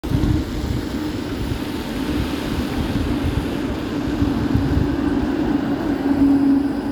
Sound effects > Vehicles
14tram intown
A tram is passing by in the city center of Tampere. Recorded on a Samsung phone.
traffic, tram